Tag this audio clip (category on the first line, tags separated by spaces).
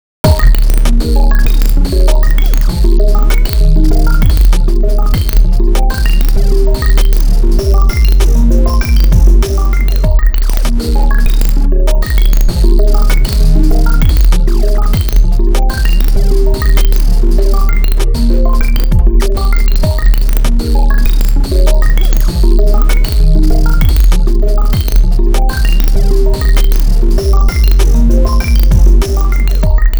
Music > Multiple instruments
melody loops wave hop hip drumloop glitchy patterns industrial idm percussion bass beats new melodies edm